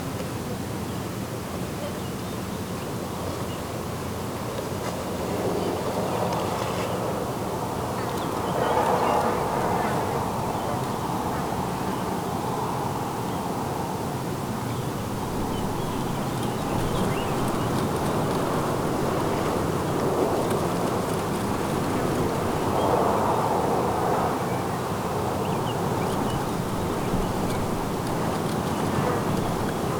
Soundscapes > Nature
Olsztyn Góry Towarne Jura Krakowsko-Częstochowska 2025-06-21 14:48 Field Recording
Mostly wind, flies and traffic noise from the distance. Unfortunatelly track is short, because of people and their noises. I had to change location.
ambience, ambient, birds, field-recording, jura, nature, olsztyn, poland, sound-note, soundscape, wind, zoom-h4n-pro